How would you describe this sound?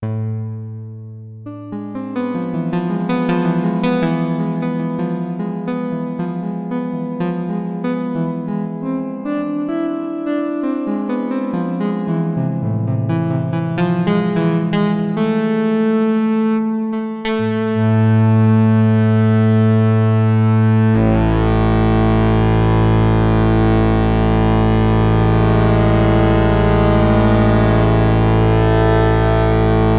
Music > Solo instrument
Soma Terra Ambient and Meditation #005
This is a recording which I did with the Soma Terra. Outside is raining and the autumn is coming. It’s becoming a bit chilly, but I am inside. It’s warm and the silence of the evening is melting around me. Recorder: Tascam Portacapture x6.